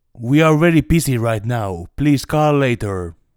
Speech > Solo speech
we are very busy right now please call later
man, male, voice, call